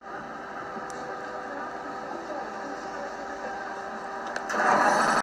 Sound effects > Vehicles
tram sounds emmanuel 1
line, tram